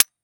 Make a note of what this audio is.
Sound effects > Objects / House appliances
Glock 17 Trigger Pull
Glock 17 pistol dry trigger pull.
firearm, no-ammo